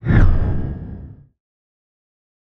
Sound effects > Other
Sound Design Elements Whoosh SFX 022
ambient, audio, cinematic, design, dynamic, effect, effects, element, elements, fast, film, fx, motion, movement, production, sound, sweeping, swoosh, trailer, transition, whoosh